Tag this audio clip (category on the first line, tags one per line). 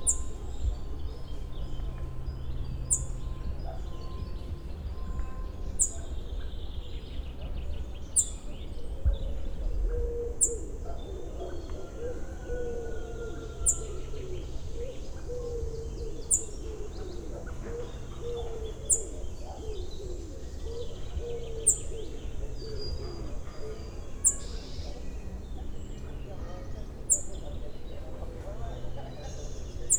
Sound effects > Animals
MKE600
Outdoor
bird
Sennheiser
Occitanie
Tascam
small-chirp
shotgun-mic
France
chirp
Rode
june
Albi
81000
NT5
FR-AV2
Tarn
2025
hypercadrioid